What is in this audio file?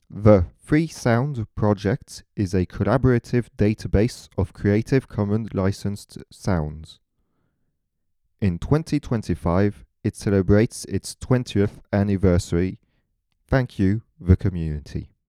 Speech > Solo speech
I thought it would be nice to re-make that project, adding in the little 20th birthday celebration. Trying to articulate and separate the words for editing. In hindsight, not very natural but hey we will see once the project flourished and is chopped up! Also having a headache all day doesn't help. But maybe it's the right continuity to re-make a project from user Stomachache? 2025 May 06.